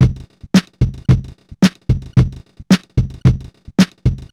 Instrument samples > Percussion
toilet quailty drums